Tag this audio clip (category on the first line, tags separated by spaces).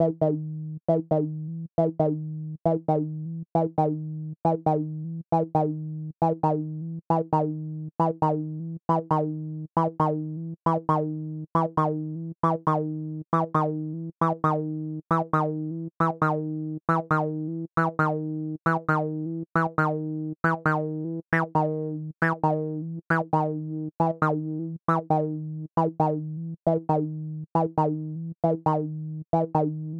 Music > Solo instrument
Recording Acid house synth electronic techno Roland 303 hardware TB-03